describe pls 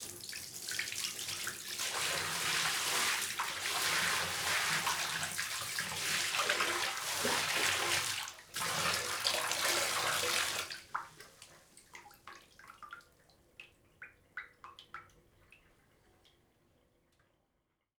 Sound effects > Objects / House appliances
Water. Bathroom. Bathtub
Bathroom, Water, Bathtub